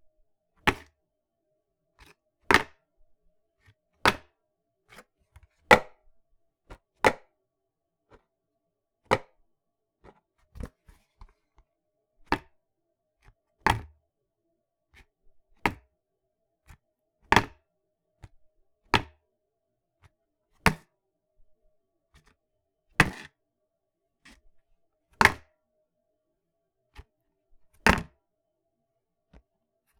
Sound effects > Objects / House appliances
Picking up and dropping a small plastic container multiple times.
pick-up, container, drop, impact, thud, plastic, tub